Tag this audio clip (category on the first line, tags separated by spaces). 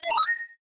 Sound effects > Electronic / Design
game-audio tonal high-pitched designed coin pick-up